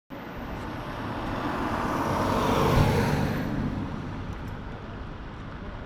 Sound effects > Vehicles
Car 2025-10-27 klo 20.12.58
Finland, Car, Field-recording